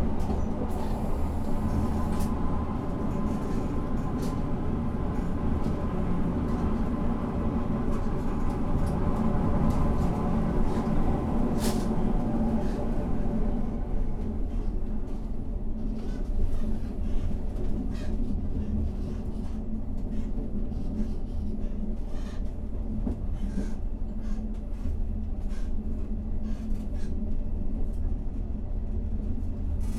Sound effects > Vehicles
just a quick take during transport
indoor, railway, train